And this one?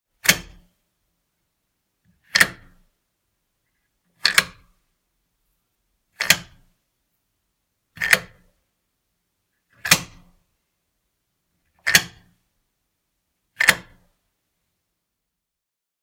Sound effects > Other mechanisms, engines, machines
Mechanical switch (latch) 03
A few mechanical toogling sounds, cool for switch, lock, opening or closing mechanism. The sound is from a door latch, made of wood, in a luxurious hotel. When I heard it, I immediately loved it, so I wanted to record it for a nice foley. Unfortunately, I only had my iPhone on hand, so the quality is limited. Recorded with an iPhone, but under ideal conditions. And then processed with RX11 and a few plugin. This sound is a few switchs, but there are solo one in the pack.
button, chest, click, close, closing, door, foley, gate, latch, lock, mechanical, mechanism, open, opening, realistic, sfx, short, switch, toggle, wood